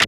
Sound effects > Electronic / Design
Time Gear2
I used 20 OTT and some waveshaper Fruity fast dist, Ohmicide and Khs phase distortion to make a patcher strain. The I used multiple Fracture to Glitch it, and put Vocodex randomly. Finaly I used Khs phase distortion to make some of its freq band distorted so that it can sound like ture gear. Sample used from: TOUCH-LOOPS-VINTAGE-DRUM-KIT-BANDLAB
Clock, Time